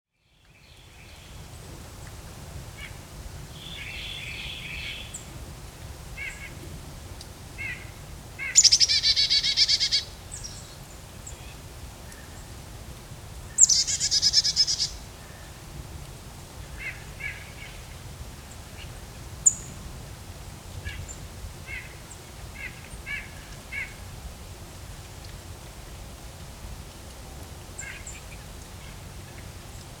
Soundscapes > Nature
Morning Birds in City Park
A recording of the morning birds in a city park in Nashville TN. Equipment: Pair omni Clippy EM272 mics. Zoom F3 field recorder.
field-recording, breeze, bird, ambiance, birds, citypark, nature, forest